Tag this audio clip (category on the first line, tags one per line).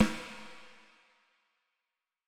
Music > Solo percussion
hit
oneshot
fx
perc
brass
snaredrum
snares